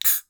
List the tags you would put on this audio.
Sound effects > Other
carbonated gas release soda

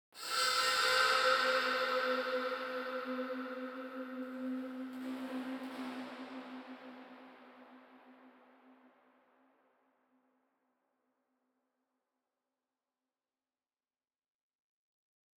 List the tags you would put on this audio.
Sound effects > Electronic / Design
effected-bird-sounds distrubed analog 1lovewav weird-bird eerie sound-effect

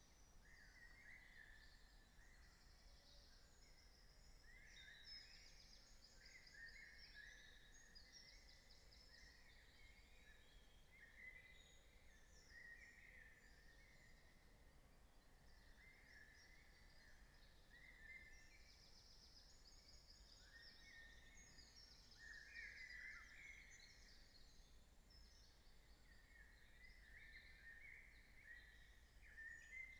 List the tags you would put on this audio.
Soundscapes > Nature
phenological-recording raspberry-pi nature artistic-intervention soundscape Dendrophone weather-data modified-soundscape alice-holt-forest sound-installation natural-soundscape data-to-sound field-recording

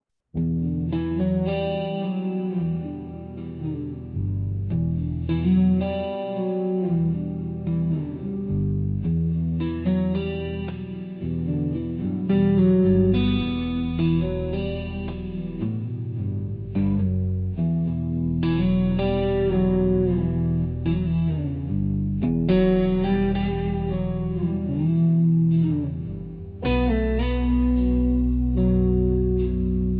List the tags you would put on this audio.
Music > Other
BM depressive electric guitar

